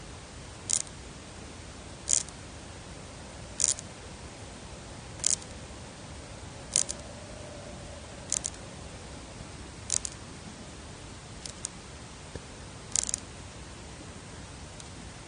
Sound effects > Animals
Sound of woodworm eating wood inside a table. Recorded with a Fairphone 3 resting on the table and amplified 30 dB with Audacity. -- Sonido de carcoma o termintas comiendo madera dentro de una mesa. Grabado con un Fairphone 3 apoyado en la mesa y amplificado 30 dB con Audacity.
larva, wood-eating, madera, wood, bug, carcoma, bicho, noise, woodworm